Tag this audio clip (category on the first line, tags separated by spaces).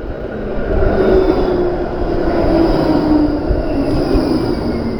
Sound effects > Vehicles
tramway transportation vehicle